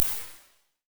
Instrument samples > Synths / Electronic
IR (Analog Device) - Late 90s Soundcraft Signature 12 - PLATE MOD
That device is noisy, but these are Soundcraft Signature 12 inbuilt reverbs :) Impulse source was 1smp positive impulse. Posting mainly for archival, but I will definetly use these!!